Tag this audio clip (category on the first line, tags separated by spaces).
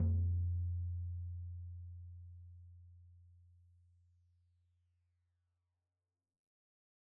Music > Solo percussion
drum fill percussion studio tomdrum